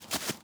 Sound effects > Natural elements and explosions
Footstep on grass
Movement on grass/leaves wearing a boot. Recorded with a Rode NTG-3.
boot, foliage, footstep, footsteps, grass, step, steps